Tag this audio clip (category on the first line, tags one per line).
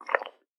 Sound effects > Human sounds and actions
drink
drinking
gulp
sip
sipping
slurp
swallow
water